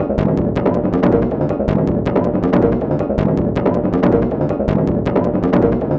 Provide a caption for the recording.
Instrument samples > Synths / Electronic
This 160bpm Synth Loop is good for composing Industrial/Electronic/Ambient songs or using as soundtrack to a sci-fi/suspense/horror indie game or short film.
Samples, Loop, Dark, Industrial, Packs, Drum, Loopable, Underground, Alien, Soundtrack, Ambient, Weird